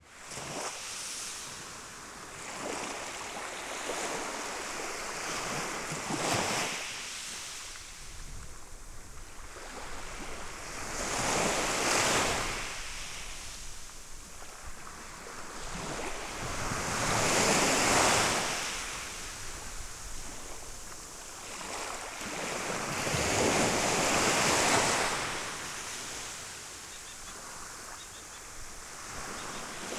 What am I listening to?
Soundscapes > Nature
250814 083954 PH Tingloy lagoon atmosphere binaural
Tingloy lagoon beach atmosphere (binaural, please use headset for 3D effects). I made this recording in the morning, at a small beach, near a place called The Lagoon by the inhabitants, on the western coast of Tingloy, a small island in Batangas Province, in the Philippines. One can hear gentle waves and wavelets lapping the shore, and some birds in the background. Recorded in August 2025 with a Zoom H5studio and Ohrwurm 3D binaural microphones. Fade in/out and high pass filter at 60Hz -6dB/oct applied in Audacity. (If you want to use this sound as a mono audio file, you may have to delete one channel to avoid phase issues).
ambience, atmosphere, island, sea, seaside, Tingloy